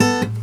Music > Solo instrument
Acoustic Guitar Oneshot Slice 67
foley, string, acoustic, twang, pluck, guitar, chord, knock, fx, strings, note, plucked, notes, sfx, oneshot